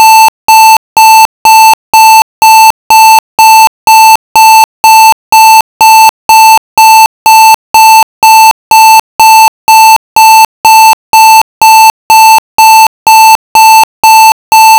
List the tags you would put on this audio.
Sound effects > Electronic / Design
square-wave digital Alarmclock A-note Alarm-clock A Alarm Tone

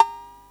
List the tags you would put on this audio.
Instrument samples > Synths / Electronic
80s Analog AnalogDrum Beat CompuRhythm CR5000 Drum DrumMachine Drums Electronic Roland Synth Vintage

